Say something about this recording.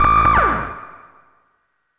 Instrument samples > Synths / Electronic
Benjolon 1 shot22
1 shot sound sampled from a modular synth. gear used: diy benjolin from kweiwen kit, synthesis technology e440 and e520, other control systems....... percussive modular synth hit. throw these in a sampler or a daw and GET IT
NOISE, HARSH, SYNTH, MODULAR, 1SHOT, DRUM, CHIRP